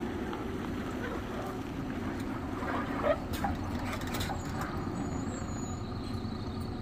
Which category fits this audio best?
Sound effects > Vehicles